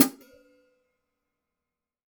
Music > Solo instrument
Vintage Custom 14 inch Hi Hat-023
Custom, Hat, Metal, Percussion